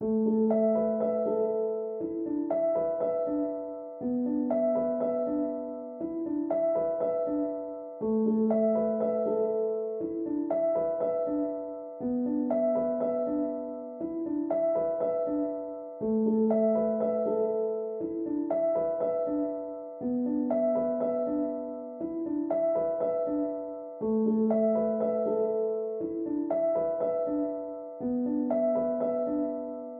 Music > Solo instrument
Piano loops 189 octave down short loop 120 bpm
120, 120bpm, free, loop, music, piano, pianomusic, reverb, samples, simple, simplesamples